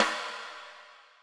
Music > Solo percussion
Snare Processed - Oneshot 134 - 14 by 6.5 inch Brass Ludwig
reverb, snare, kit, crack, drumkit, drums, fx, snareroll, roll, drum, realdrum